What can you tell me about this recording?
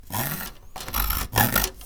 Sound effects > Other mechanisms, engines, machines
metal shop foley -116
tink, sound, knock, shop, boom, oneshot, wood, thud, tools, rustle, bang, sfx, foley, percussion, metal, strike, crackle, pop, bop, fx, bam, little, perc